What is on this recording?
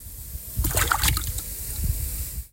Sound effects > Natural elements and explosions
Recorded In A Garden At Roccasecca, On iPhone 12 Pro Max And Mastered In Ableton Live 9 Suite, And Audacity. A Water Splash Light Like On A Cartoon Comedy Like. When The Person Splashes In A River, Or Beach, Pool, Or Many Others, Or Splashing In A Water And Many Others.. Also Summer Is Out On 14th Of September 2025 And Goes To Back To School.. With A New Fall Season.